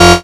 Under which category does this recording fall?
Instrument samples > Synths / Electronic